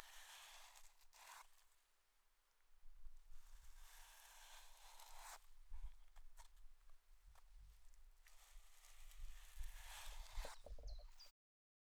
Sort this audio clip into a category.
Sound effects > Human sounds and actions